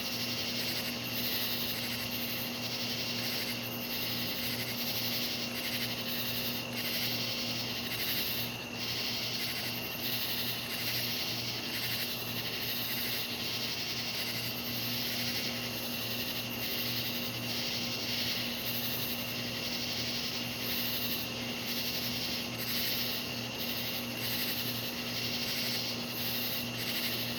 Soundscapes > Nature
Raleigh Suburbs
High quality recording of a typical Raleigh night. Hot, humid summer evening, with the distant sounds of critters in the forest, and the gentle hum of a suburban air-conditioner in the background. Recorded with a Tascam DR-100mkii, processed in Pro Tools